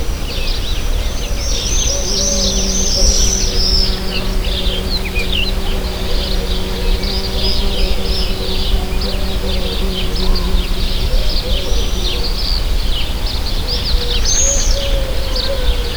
Soundscapes > Urban
250710 20h50 Esperaza Nature and bugs MKE600
Sennheiser MKE600 with stock windcover P48, no filter. Weather : Clear sky, little wind. Processing : Trimmed in Audacity.